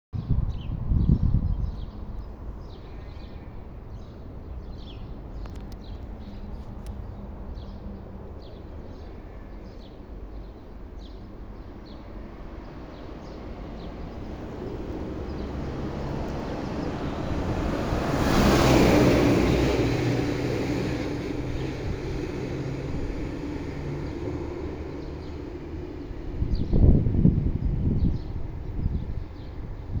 Sound effects > Animals
20250515 1523 sheep and cars phone microphone
sheep and cars
atmophere, field